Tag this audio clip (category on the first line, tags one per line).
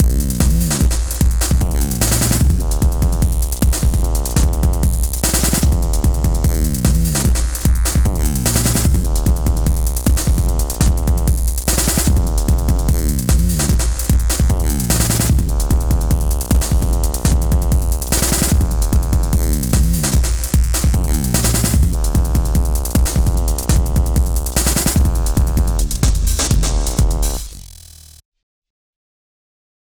Music > Other
loops
acid
nosie